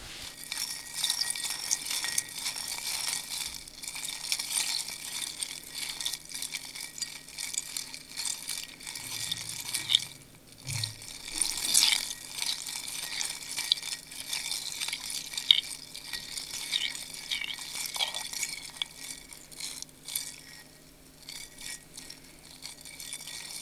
Sound effects > Human sounds and actions
Stirring ice in water
Stirring thin icy shards in a glass of water
cubes, ice, liquid, shards, stirring